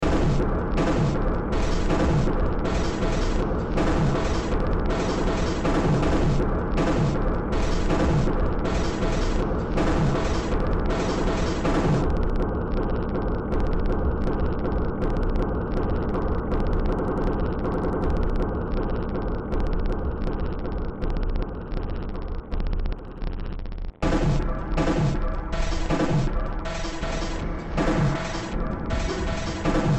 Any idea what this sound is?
Music > Multiple instruments
Demo Track #2930 (Industraumatic)
Cyberpunk, Noise, Underground, Industrial, Sci-fi, Horror, Soundtrack, Games, Ambient